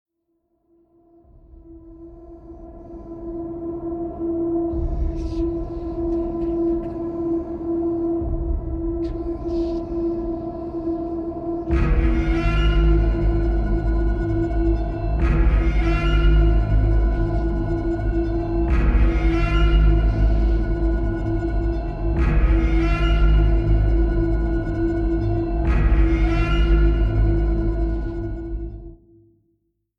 Soundscapes > Synthetic / Artificial
Tense Horror Atmosphere
Creepy and suspenseful horror atmosphere that builds tension and unease, perfect for frightening scenes, dark visuals, and scary games.
tense, eerie, atmosphere